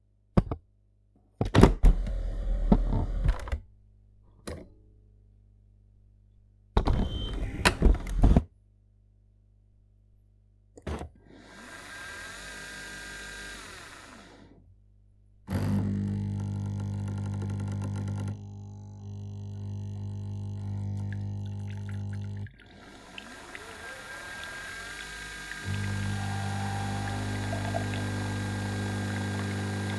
Sound effects > Objects / House appliances
FOODMisc 32bF Nespresso Espresso Machine
Recording of making coffee with a Nespresso coffee maker from start to finish on a Zoom H4n Stereo